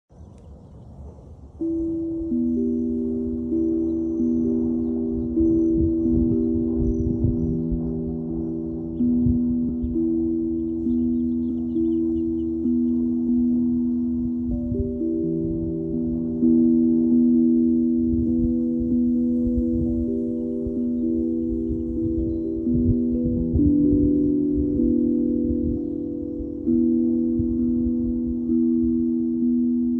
Music > Solo instrument
Waves Of Wind - Ambient Tongue Drum
Ambient, Outdoors, Reverb